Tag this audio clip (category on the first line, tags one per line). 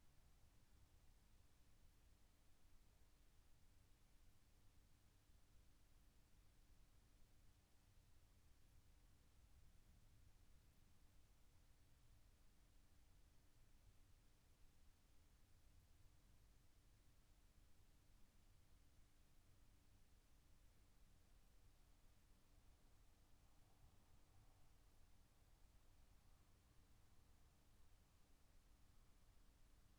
Soundscapes > Nature
field-recording
soundscape
natural-soundscape
raspberry-pi
alice-holt-forest